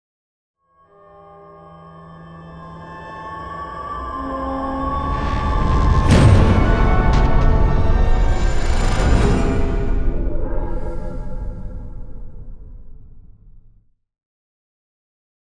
Sound effects > Other
All samples used in the production of this sound effect were recorded by me. I designed this effect using the ASM Hydrasynth Deluxe and field recordings I made with a Tascam Portacapture x8 recorder and a RØDE NTG5 microphone. Post-production was done in REAPER DAW.